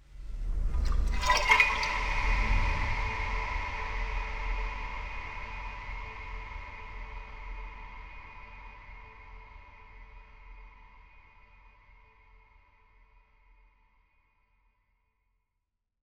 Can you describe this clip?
Sound effects > Experimental
Scary sloshing water ambience 3
A variety of water sounds processed with reverb and other effects, creating a sort of creepy, watery atmosphere.